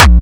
Instrument samples > Percussion
OldFiles-Classic Crispy Kick 1-A#
Synthed with phaseplant only, just layered 2 noise generator and a 808 kick that synthed with sine wave, then overdrived them all in a same lane. Processed with Khs Distrotion, Khs Filter, Khs Cliper. Final Processed with ZL EQ, OTT, Waveshaper.